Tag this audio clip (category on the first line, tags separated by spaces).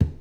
Sound effects > Objects / House appliances
bucket carry clang clatter cleaning container debris drop fill foley garden handle hollow household kitchen knock lid liquid metal object pail plastic pour scoop shake slam spill tip tool water